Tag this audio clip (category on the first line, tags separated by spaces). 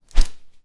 Sound effects > Objects / House appliances
NT5 FR-AV2 Whoosh Rode Plastic Hanger coat-hanger Fast swinging Transition Airy swing SFX Tascam